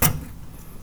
Sound effects > Other mechanisms, engines, machines
Handsaw Oneshot Hit Stab Metal Foley 18
smack, perc, saw, metallic, shop, vibe, percussion, metal, fx, sfx, handsaw, foley, plank, vibration, hit, tool, household, twangy, twang